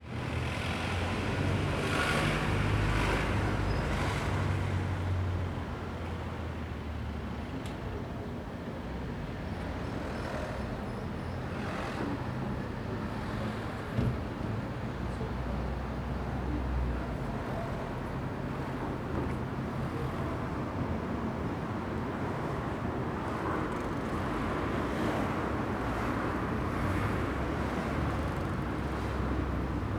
Soundscapes > Urban
Splott - Ambience Busy Traffic Cars Lorries Birds - Splott Road
wales, splott, fieldrecording